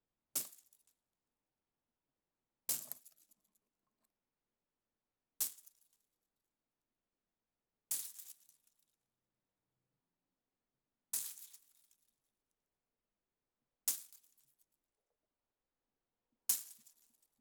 Sound effects > Natural elements and explosions
Leaf shot
steping on leaves Recorded with zoom H2n, edited with RX